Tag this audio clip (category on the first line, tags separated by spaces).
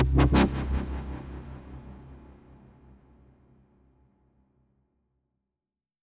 Instrument samples > Synths / Electronic
FLSTUDIO AUDACITY T-Force-Alpha-Plus